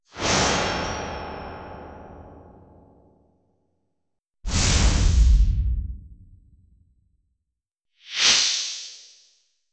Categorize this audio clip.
Sound effects > Electronic / Design